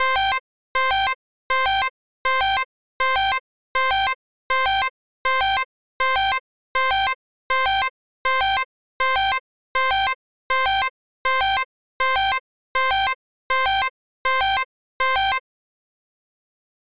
Sound effects > Electronic / Design
A designed alarm SFX created in Phaseplant VST.
alarm, alert, danger, error, repeating, sci-fi, warning